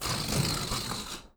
Sound effects > Objects / House appliances
RUBRFric-Blue Snowball Microphone, CU Balloon Deflating, Farting Nicholas Judy TDC
A rubber balloon deflating. Farting.